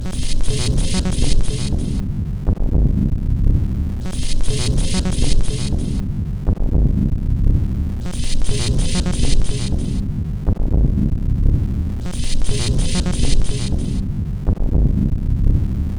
Instrument samples > Percussion
This 120bpm Drum Loop is good for composing Industrial/Electronic/Ambient songs or using as soundtrack to a sci-fi/suspense/horror indie game or short film.
Dark, Underground, Drum, Loop, Ambient, Industrial, Alien, Loopable, Packs, Samples, Soundtrack, Weird